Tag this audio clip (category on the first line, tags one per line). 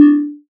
Instrument samples > Synths / Electronic
fm-synthesis
additive-synthesis
bass